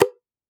Sound effects > Objects / House appliances
Ribbon Trigger 5 Tone
A stretched satin ribbon, played like a string, recorded with a AKG C414 XLII microphone.
ribbon, satin-ribbon